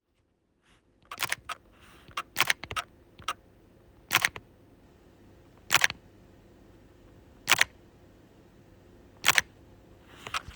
Sound effects > Other mechanisms, engines, machines
Fujifilm x-t3 shutter sounds. I made the recording myself on an iPhone 12